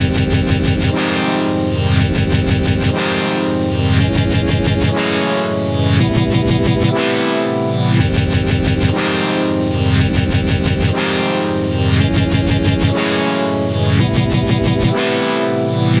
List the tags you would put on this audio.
Music > Other
120bpm
bridge
evolution
lofi
loop
musical-loop
synth
variation

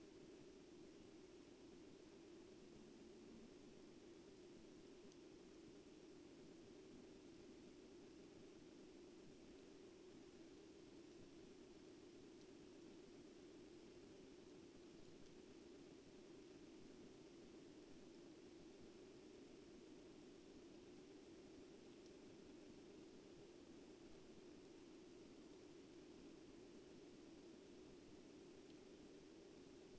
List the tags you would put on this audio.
Soundscapes > Nature
alice-holt-forest
artistic-intervention
data-to-sound
Dendrophone
field-recording
modified-soundscape
nature
phenological-recording
raspberry-pi
sound-installation
soundscape
weather-data

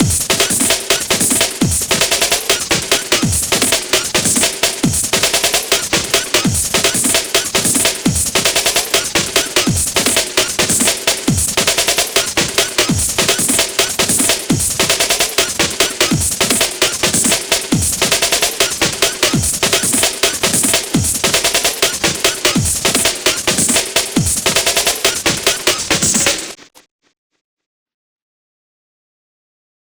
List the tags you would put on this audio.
Music > Other
loop
jungle
breaks
drums
breakcore

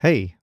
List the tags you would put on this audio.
Speech > Solo speech
Tascam
Adult
hey
mid-20s
Voice-acting
VA
MKE-600
MKE600
FR-AV2
Generic-lines
Shotgun-mic
2025
Greeting
Hypercardioid
Single-mic-mono
Male
Sennheiser
Calm
july
Shotgun-microphone

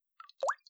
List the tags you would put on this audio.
Sound effects > Natural elements and explosions
wet
dripping
liquid
drips
water

